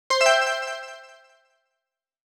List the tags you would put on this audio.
Sound effects > Electronic / Design
machine
soundeffect
command
interface
game
UI
sound
computer
sfx

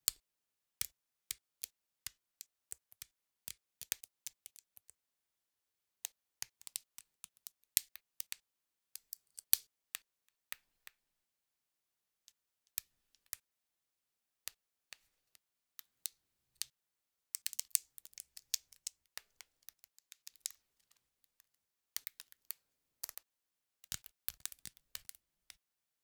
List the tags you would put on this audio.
Sound effects > Natural elements and explosions
bark
bonfire
burning
campfire
crackle
crackling
fire
wood

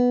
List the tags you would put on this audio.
Instrument samples > String
arpeggio
cheap
design
guitar
sound
stratocaster
tone

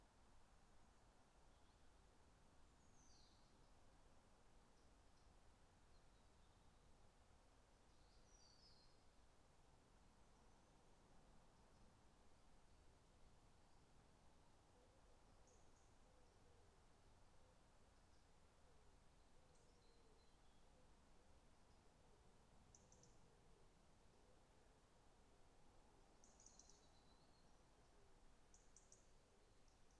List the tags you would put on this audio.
Soundscapes > Nature
Dendrophone
sound-installation
soundscape
weather-data

